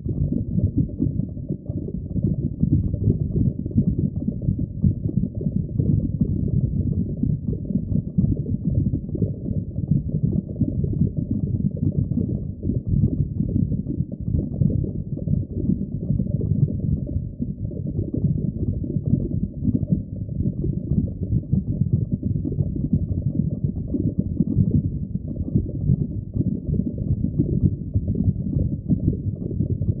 Soundscapes > Nature
Human Drops Water(Deep a bit)
Water, Droped, bubble, Drown